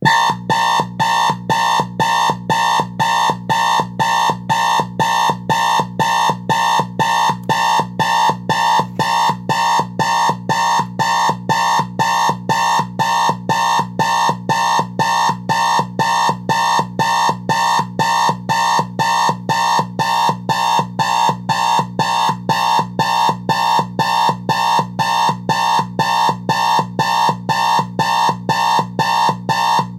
Sound effects > Objects / House appliances
ALRMClok-Samsung Galaxy Smartphone, CU Digital, Radio, 70s, 80s, Buzzing Nicholas Judy TDC
A 70's or 80's digital radio alarm clock buzzing.